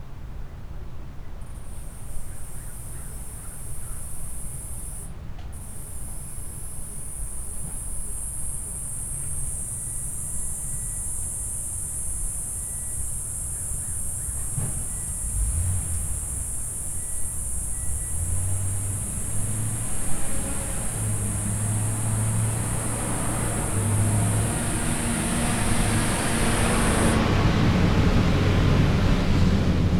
Sound effects > Vehicles
Crickets Interupted by Amazon Van 07142025
Crickets start but are interrupted by an Amazon van in Davis.
Amazon, van